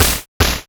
Instrument samples > Percussion
[CAF8bitV2]8-bit Snare1-E Key-Dry&Wet
8-bit, 8bit, Game, Snare